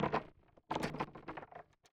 Sound effects > Objects / House appliances
WoodDoor Creak 04
Short creak sound resulting from a moving or deformation of an old wooden door.
deformation, from, a